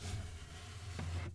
Sound effects > Objects / House appliances
wooden chair slide on floor2
Sliding a wooden chair across a floor.